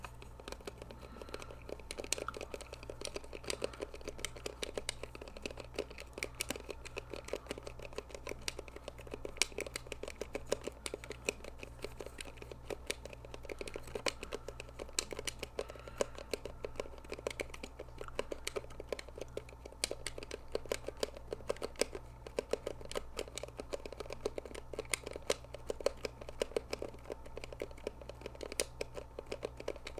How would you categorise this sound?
Sound effects > Objects / House appliances